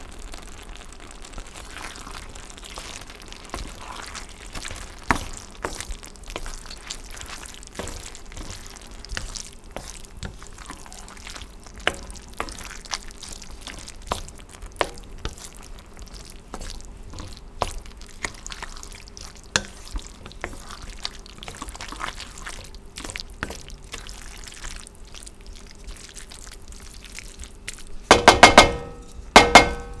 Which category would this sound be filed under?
Sound effects > Objects / House appliances